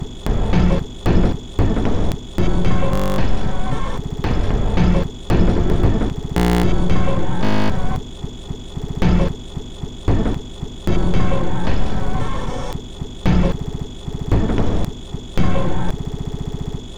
Instrument samples > Percussion
This 113bpm Drum Loop is good for composing Industrial/Electronic/Ambient songs or using as soundtrack to a sci-fi/suspense/horror indie game or short film.
Weird, Packs, Alien, Underground, Dark, Loopable, Ambient, Loop, Soundtrack, Drum, Industrial, Samples